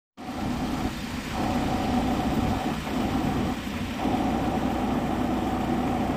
Sound effects > Vehicles
final bus 9
bus, finland, hervanta